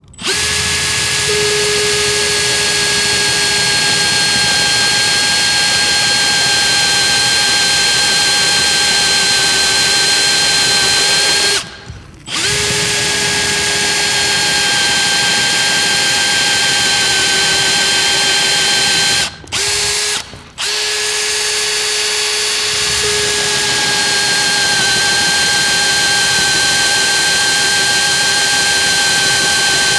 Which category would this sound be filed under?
Sound effects > Other mechanisms, engines, machines